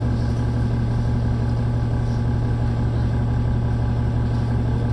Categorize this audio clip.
Sound effects > Vehicles